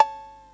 Instrument samples > Synths / Electronic
Clawbell-CR5000 02
Beat, Vintage, Electronic, Drum, AnalogDrum, Drums, Analog, DrumMachine, CompuRhythm, Synth, Roland, 80s, CR5000